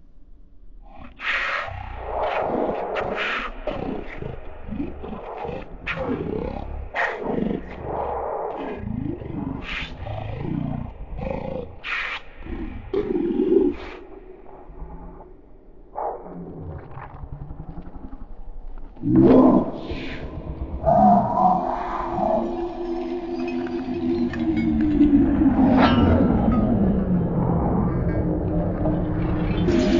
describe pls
Sound effects > Animals
Sounds of the beast in the dark cave with dark metallic sounds in the background
There is a scary monster in the shades of the dark cave with terrifying nature echoing.
animal, beast, bogey, creature, creepy, dark, deep, fear, ghost, haunted, horror, minotaurus, monster, phantom, roar, scary, snarl, suspense, thrill